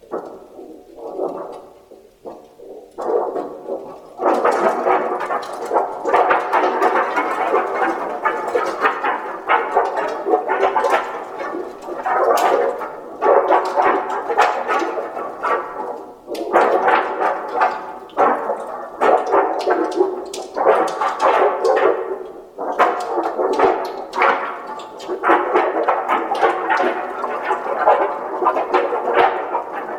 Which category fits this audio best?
Sound effects > Objects / House appliances